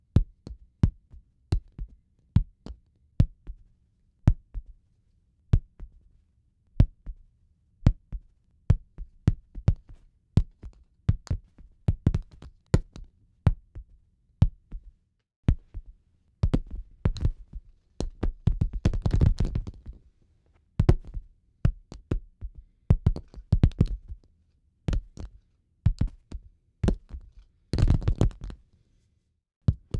Sound effects > Natural elements and explosions
Acorns or nuts falling from tree onto ground
Ok so these are actually chestnuts falling onto a futon, but you get the idea. A bunch of single hits, double hits, and clusters. Some clack and clatter as they roll into each other. Recorded with a Rode NTG 5 indoors
ground, nuts, thud, clatter, clack, fall, drop